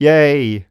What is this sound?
Speech > Solo speech
Joyful - YAY
dialogue, excited, FR-AV2, happy, Human, joy, joyful, Male, Man, Mid-20s, Neumann, NPC, oneshot, singletake, Single-take, talk, Tascam, U67, Video-game, Vocal, voice, Voice-acting, yay